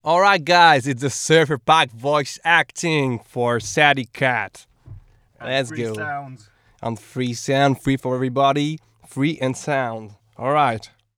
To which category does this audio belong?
Speech > Solo speech